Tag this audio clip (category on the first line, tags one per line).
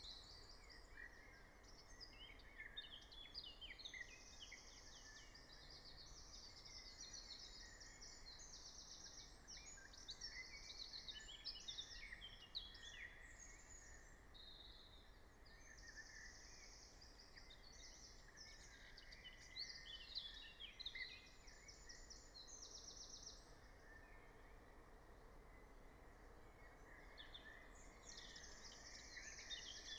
Soundscapes > Nature
soundscape
meadow
raspberry-pi
natural-soundscape
nature
phenological-recording
field-recording
alice-holt-forest